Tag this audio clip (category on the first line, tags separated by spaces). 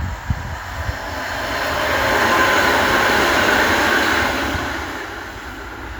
Soundscapes > Urban
Drive-by Tram field-recording